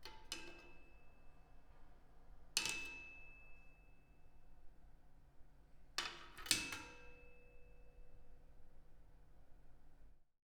Sound effects > Objects / House appliances
Hitting metal object in basement 2
Hitting a random metal object in my apartment building's basement.
Metallic, Hit, Metal